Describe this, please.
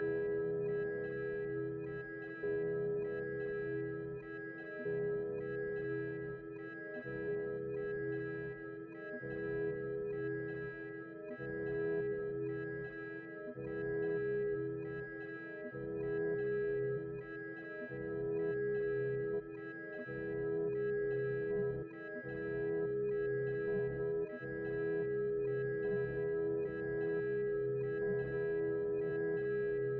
Soundscapes > Synthetic / Artificial
Loop made in the LUNA DAW from the OPAL synth and captured into a sampler.
Movie, OPAL, Texture, Drone, Ringmod, Dream, Synth, LUNA